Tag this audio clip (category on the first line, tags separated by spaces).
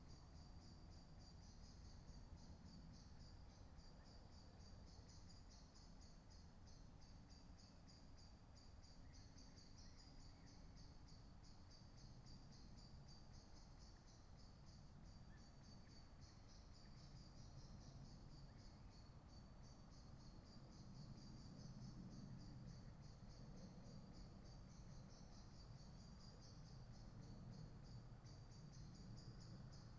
Soundscapes > Nature
data-to-sound alice-holt-forest raspberry-pi field-recording weather-data soundscape artistic-intervention phenological-recording natural-soundscape modified-soundscape sound-installation Dendrophone nature